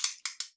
Instrument samples > Percussion
Cellotape Percussion One Shot5
adhesive, ambient, cellotape, cinematic, creativepercussion, drumoneshots, electronic, experimentalpercussion, foley, foundsound, glitch, IDM, layeringsounds, lofi, oneshot, organi, percussion, samplepack, sounddesign, tapepercussion, tapesounds, texture, uniquepercussion